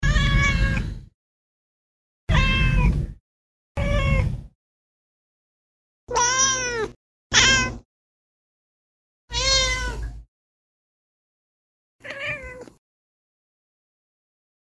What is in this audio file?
Sound effects > Animals
ANMLCat Cat Meowing Nicholas Judy TDC
A cat meowing.
cat, meow, Phone-recording, animal